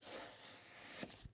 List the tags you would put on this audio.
Sound effects > Other
Chief
Slice
Cooking
Vegetable
Kitchen
Chef
Cut
Indoor
Cook
Knife
Home

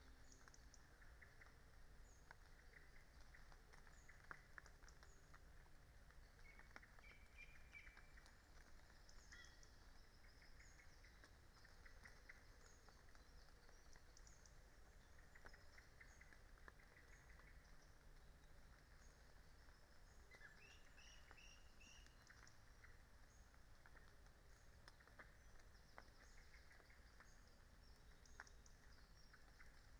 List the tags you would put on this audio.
Soundscapes > Nature
alice-holt-forest
natural-soundscape
weather-data

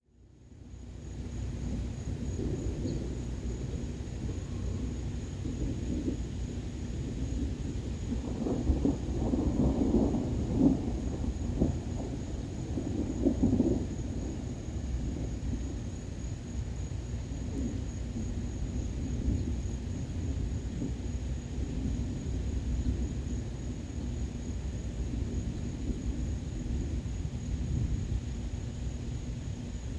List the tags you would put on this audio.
Soundscapes > Nature
cicadas
crickets
dusk
Phone-recording
thunderstorm

